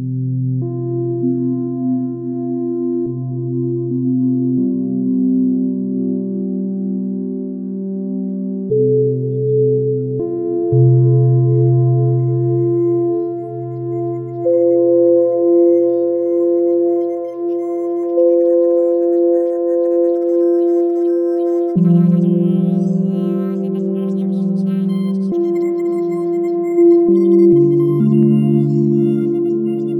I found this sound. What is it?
Soundscapes > Synthetic / Artificial
Ambient Affresco Positive Soundscape with Pads, Drones and FM
Ambient soundscape background texture made with miRack for iPad. The sound is relaxing, meditative, with low frequency tones mixed up with drones and FM.
background-sound, miRack